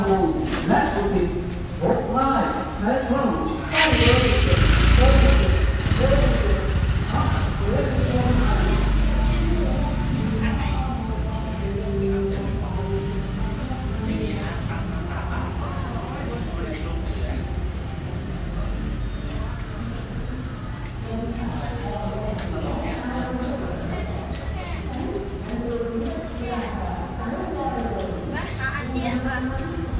Soundscapes > Urban
Guesthouse Street Ambience, Sawatdee, Bangkok, Thailand (Feb 23, 2019)
Ambient recording near Sawatdee Guesthouse in Bangkok, Thailand, on February 23, 2019. Includes local street sounds, conversations, and passing traffic.
ambience, Bangkok, conversations, field, guesthouse, recording, Sawatdee, street, Thailand, traffic, urban